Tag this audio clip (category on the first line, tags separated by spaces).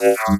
Sound effects > Electronic / Design
interface options